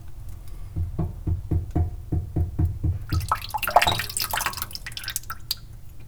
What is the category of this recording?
Sound effects > Objects / House appliances